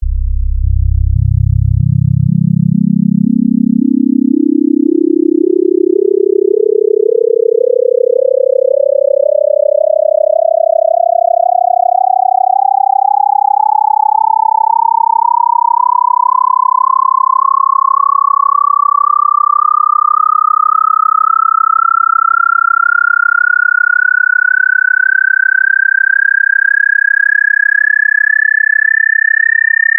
Instrument samples > Synths / Electronic
07. FM-X RES2 SKIRT1 RES0-99 bpm110change C0root
MODX, Yamaha, FM-X, Montage